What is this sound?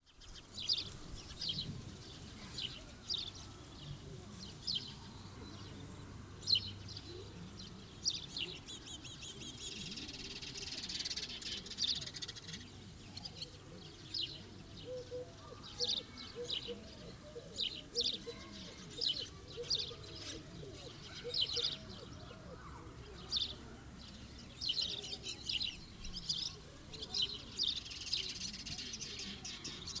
Soundscapes > Nature
empty schoolyard in Tunisia countryside
ambiance, ambience, birds, chicken, countryside, El-Kef, field-recording, school, school-yard, sparrow, sparrows, Tunisia, Tunisie, voices, women